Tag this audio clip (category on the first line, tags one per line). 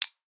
Sound effects > Human sounds and actions
activation
button
click
interface
off
switch
toggle